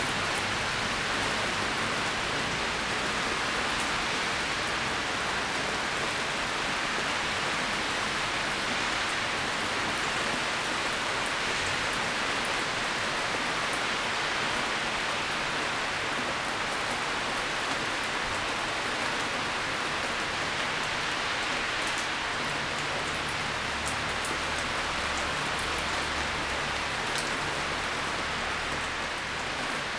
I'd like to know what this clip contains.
Soundscapes > Nature
Rain falling in an urban environment with faint traffic noise in the background.
urban, city, weather, wet, traffic, street, ambience, rain